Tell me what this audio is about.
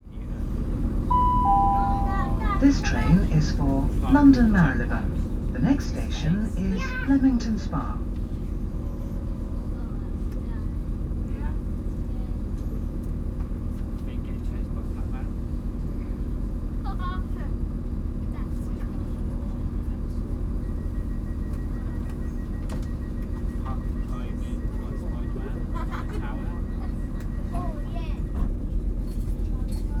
Soundscapes > Indoors
An interior recording of a train.
ambience, filed, interior, recording, Talking, Tannoy, train